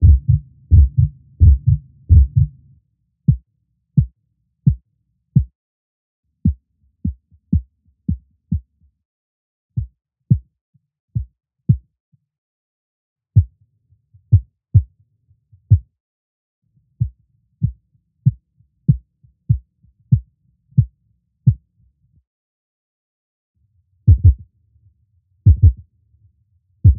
Sound effects > Experimental
Nicotine Kicks
Recording of tapping a nicotine pouch container, processed to make sound like a heartbeat
deep, heartbeat, recorded, sample, techno